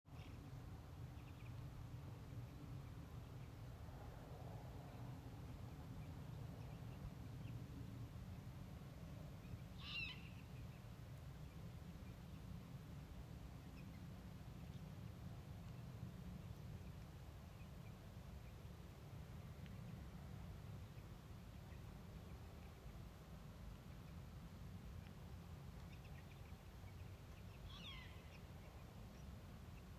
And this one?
Soundscapes > Nature
Mill St ambience and beaming full moon 08/19/2024
Night moon ambience
moon, ambience